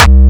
Instrument samples > Percussion
Classic Crispy Kick 1-C
crispy, brazilianfunk, powerkick, distorted, Kick, powerful